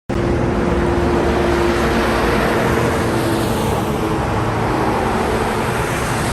Sound effects > Vehicles
Sun Dec 21 2025 (15)
road, truck, highway